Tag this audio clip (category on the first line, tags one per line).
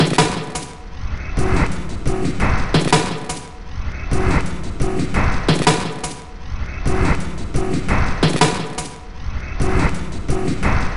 Instrument samples > Percussion
Loopable
Loop
Ambient
Soundtrack
Drum
Dark
Industrial
Packs
Weird
Underground
Alien
Samples